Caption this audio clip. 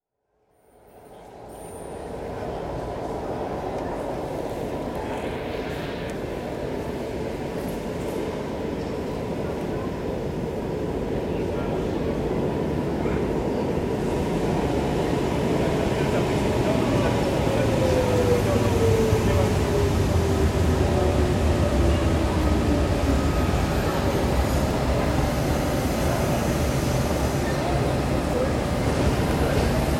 Soundscapes > Urban
Nationaltheatret Train Station Ambience
This is an audio recording of the ambience of Nationaltheatret railway station in the city centre of Oslo. The recording was made on an iPhone SE using the built-in internal microphone.
announcement, station